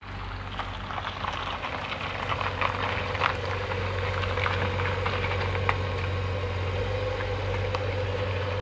Sound effects > Vehicles
electric vehicle driving by
car, electricvehicle, ev